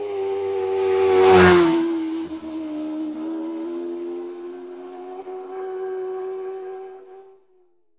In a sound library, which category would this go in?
Sound effects > Vehicles